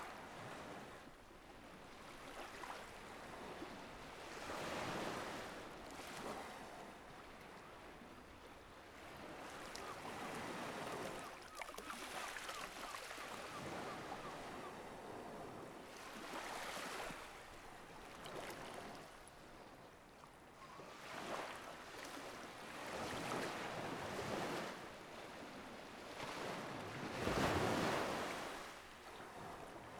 Soundscapes > Nature
Sea. Seagulls. Light surf.
sea, seagull, waves